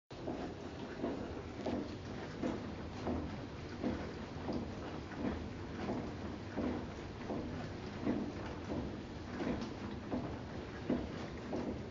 Sound effects > Objects / House appliances
In-home recording of a dishwasher in action. Domestic background material. Recorded on an iPhone 15 using Voice Recorder. No external microphone.
ambient, background, mundane, rhythmic